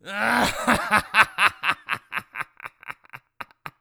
Sound effects > Human sounds and actions
evil laugh 2
man, male, sound, laugh, evil, human